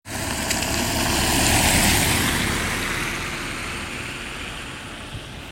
Sound effects > Vehicles
car rain 03
vehicle, rain, engine, car